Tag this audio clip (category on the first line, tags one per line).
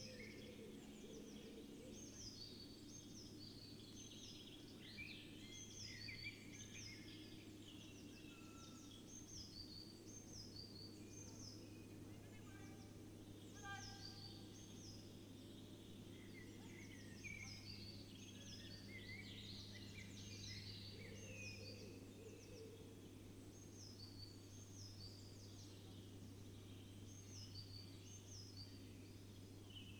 Soundscapes > Nature
alice-holt-forest
natural-soundscape
sound-installation